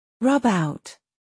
Speech > Solo speech
rub out
english, pronunciation, voice, word